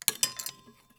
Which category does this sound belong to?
Sound effects > Other mechanisms, engines, machines